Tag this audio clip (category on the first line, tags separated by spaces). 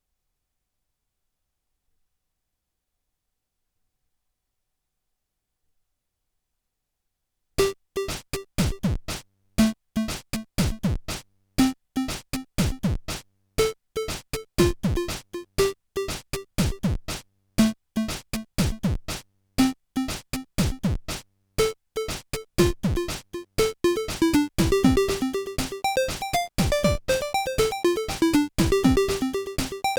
Music > Other
8-bit chiptune pocket-operator video-game